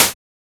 Instrument samples > Percussion
8 bit-Noise Open Hat4
8-bit, FX, game, percussion